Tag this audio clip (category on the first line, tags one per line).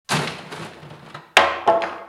Sound effects > Natural elements and explosions
glass
crash
smash